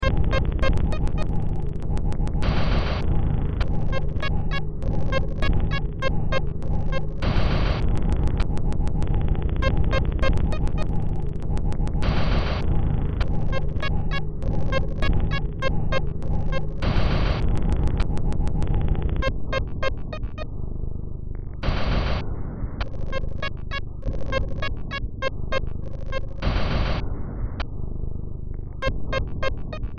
Music > Multiple instruments
Demo Track #3968 (Industraumatic)
Cyberpunk, Games, Horror, Industrial, Noise, Sci-fi, Soundtrack, Underground